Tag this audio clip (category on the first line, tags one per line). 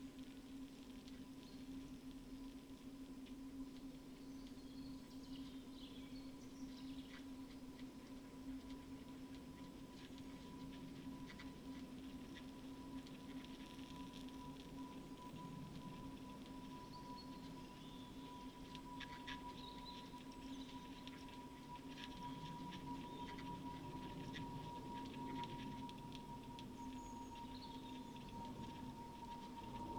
Soundscapes > Nature
artistic-intervention
data-to-sound
Dendrophone
modified-soundscape
phenological-recording
raspberry-pi
sound-installation
weather-data